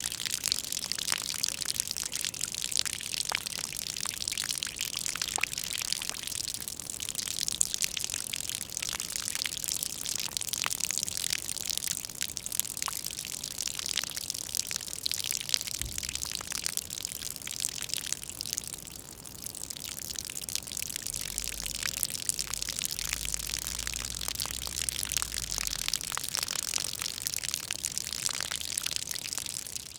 Soundscapes > Urban
Chorro de agua en poza
Water sound striking on a water surface.
field, Puchuncavi, water, recording, South, Chile, Valparaiso, America